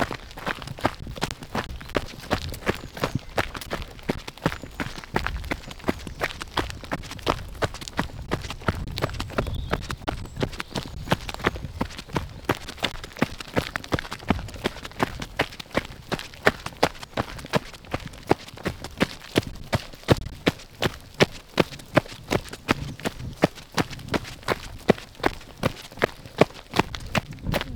Sound effects > Human sounds and actions
A person running on gravel with birds chirping in the background.
birds, nature, park, run, running